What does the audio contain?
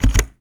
Sound effects > Objects / House appliances
FUJITSU Computers Keyboard - Delete key Press Mono
Subject : A all white FUJITSU keyboard key being pressed. Date YMD : 2025 03 29 Location : Thuir Theatre, South of France. Hardware : Zoom H2N, MS mode. Using the middle side only. Handheld. Weather : Processing : Trimmed and Normalized in Audacity.
individual-key, Zoom-H2N, H2N, Close-up, Keyboard, key-press, Zoom-Brand, Key